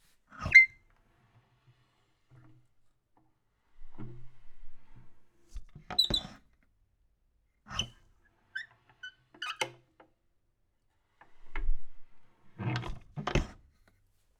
Sound effects > Objects / House appliances
Fireplace door
Subject : The door of a indoor fireplace or wood-stove. Date YMD : 2025 04 Location : Gergueil France Hardware : Tascam FR-AV2 and a Rode NT5 microphone in a XY setup. Weather : Processing : Trimmed and Normalized in Audacity. Maybe with a fade in and out? Should be in the metadata if there is.
2025, Dare2025-06A, Door, fireplace, FR-AV2, hinge, indoor, lever, NT5, Rode, Tascam